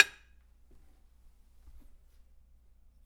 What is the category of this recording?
Sound effects > Objects / House appliances